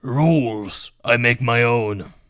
Speech > Solo speech
rules?, i make my own
male, man, videogame, voice